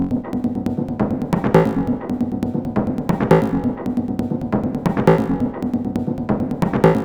Instrument samples > Synths / Electronic
This 136bpm Synth Loop is good for composing Industrial/Electronic/Ambient songs or using as soundtrack to a sci-fi/suspense/horror indie game or short film.
Industrial, Loopable, Alien, Samples, Underground, Drum, Weird, Dark, Soundtrack, Packs, Loop, Ambient